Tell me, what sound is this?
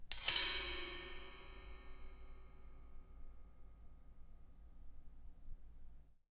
Sound effects > Objects / House appliances
Hitting metal staircase 5
Hitting the metal part of the staircase in my apartment building.
Echo, Metal, Metallic, Staircase, Stairs